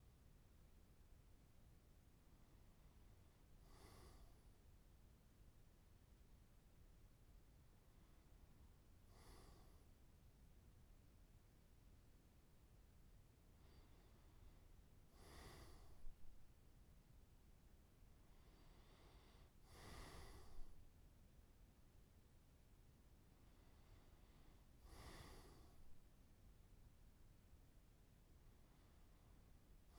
Soundscapes > Indoors
The sound of a man breathing while deep sleeping in REM phase.
breathing, sleep, sleeping